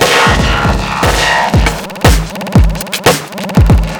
Music > Solo percussion
Industrial Estate 35
From a pack of samples created with my modular system and optimized for use in Ableton Live. The "Industrial Estate" loops make generous use of metal percussion, analog drum machines, 'micro-sound' techniques, tape manipulation / digital 'scrubbing', and RF signals. Ideal for recycling into abrasive or intense compositions across all genres of electronic music.
120bpm, loops